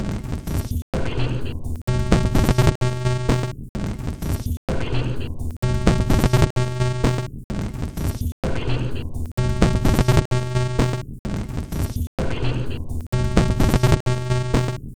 Sound effects > Experimental
This 128bpm Glitch Loop is good for composing Industrial/Electronic/Ambient songs or using as soundtrack to a sci-fi/suspense/horror indie game or short film.
Ambient, Loopable, Dark, Soundtrack, Underground, Samples, Alien, Packs, Weird, Drum, Loop, Industrial